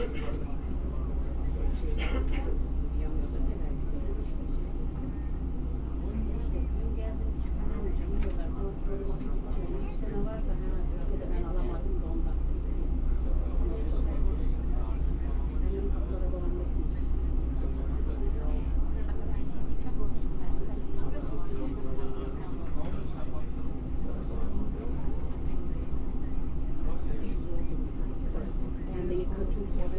Soundscapes > Urban
AMBTran UBahn Subway Wien Ambience Walla Conversation Trainsounds Vienna
subway ride in vienna, wagon full of people recorded with 2 x Clippy EM272 + Zoom F3
Trains, Subway, Walla, Vienna, Public